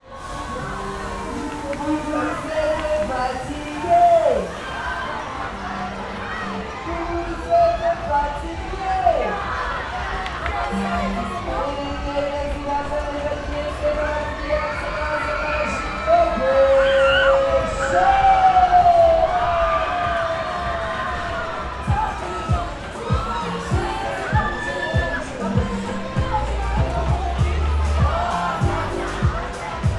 Soundscapes > Urban
250424 173154-2 FR Teen-agers enjoying funfair in Paris
Teen-agers enjoying a fairground ride at a funfair in Paris, France. (2nd file) I made this recording while teen-agers were enjoying a fairground ride in a famous funfair called ‘’la Foire du Trône’’, taking place in eastern Paris (France), every year during late spring. Recorded in April 2025 with a Zoom H6essential (built-in XY microphones). Fade in/out applied in Audacity.
fairground, field-recording, funfair, kids, ambience, voices, noise, teen-agers, ride, Paris, screaming, fun, crowd, children, people, rides, lively, attraction, machine, walla, France, scream, soundscape, noisy, music, rollercoaster, atmosphere, machines, amusement-park, roller-coaster